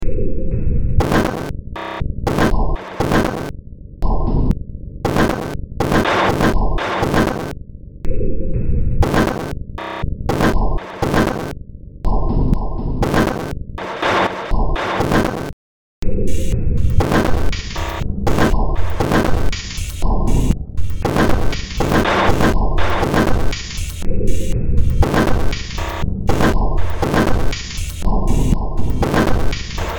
Music > Multiple instruments
Demo Track #3490 (Industraumatic)
Track taken from the Industraumatic Project.